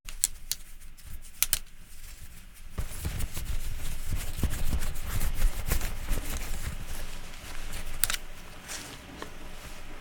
Sound effects > Human sounds and actions
Wiping down a pair of glasses with a cloth, some clacking of the earpieces mixed in.